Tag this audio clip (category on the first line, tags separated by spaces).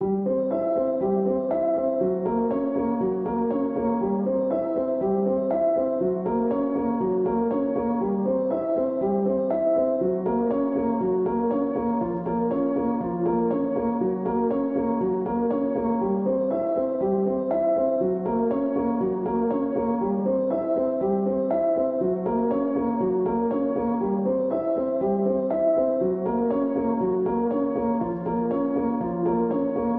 Music > Solo instrument
loop 120bpm reverb piano music 120 pianomusic simple free simplesamples samples